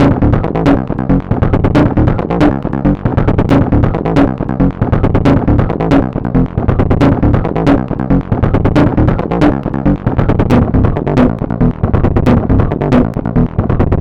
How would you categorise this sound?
Instrument samples > Percussion